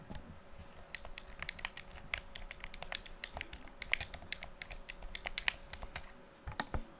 Sound effects > Other
Playing with a Controller
Using a video game controller
Controller, Object